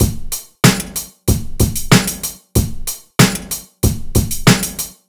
Music > Other
FL studio 9 pattern construction with kits drums